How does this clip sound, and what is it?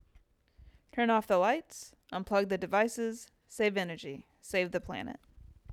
Speech > Solo speech
PSA – Save Energy
Short PSA promoting energy conservation for a better environment. Script: "Turn off lights. Unplug devices. Save energy, save the planet."